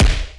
Instrument samples > Percussion
Phonk Stomping Snare
Retouched from 99Sounds-Punching Percussion sample pack. Processed with ZL EQ and Khs Distortion.
Phonk, Snare, Stomp